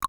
Sound effects > Other
opend door 2
It's a sound created for the game Dungeons and Bubbles for The Global Game Jam 2025
foley, cross, open, burts